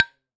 Sound effects > Objects / House appliances
Slate hit
Subject : Two slate rocks hitting each other. Date YMD : 2025 04 20 Location : Gergueil France. Hardware : Zoom H2n Mid mic; Weather : Processing : Trimmed and Normalized in Audacity. Maybe some fade in/out.
foley, H2N, Pencil, pencils, perc, tapping, Zoom